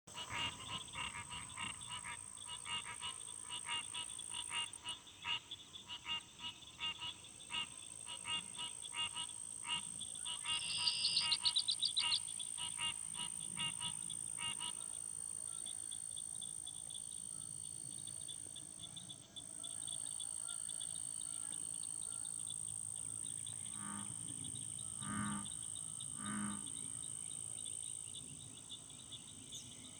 Sound effects > Animals
Wetland - Evening Pond at Hawk's Point Golf Course; Includes American Green Tree Frog, American Bullfrog, and Various Birds
Evening at Hawk's Point Golf Course at the pond where the calls of American green tree frog, American bullfrog, Canada goose, red-winged blackbird, and northern mockingbird are heard.
tree-frog, red-winged-blackbird